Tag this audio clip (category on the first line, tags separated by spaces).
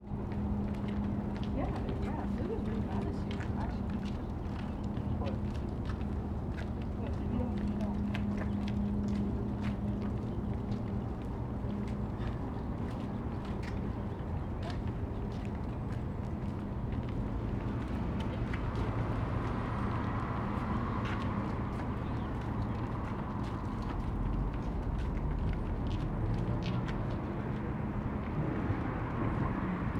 Soundscapes > Urban
sidewalk chatting city soundscape traffic street field-recording people walking ambience pedestrian